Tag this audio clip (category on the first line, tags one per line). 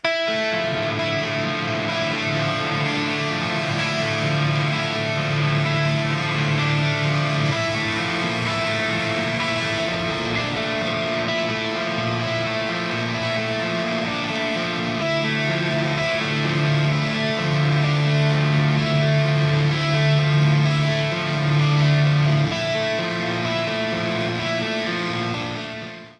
Music > Other
sample
depressive
electric
guitar
BM